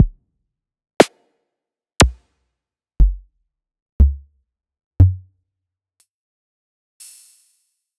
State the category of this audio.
Instrument samples > Percussion